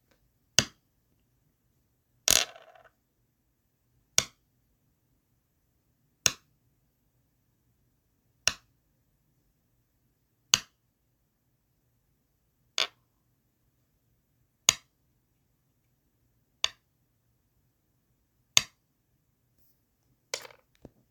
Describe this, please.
Sound effects > Objects / House appliances
the sound of placing a stone on the Go board (or Baduk/Omok board)